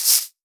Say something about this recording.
Sound effects > Objects / House appliances
LoFi Scribble-04
Pencil on rough paper or parchment, or scratching on a rough, sandy surface. Foley emulation using wavetable synthesis.
paper, parchment, pencil, scribble, writing